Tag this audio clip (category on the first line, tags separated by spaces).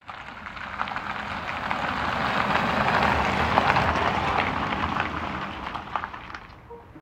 Sound effects > Vehicles
driving electric vehicle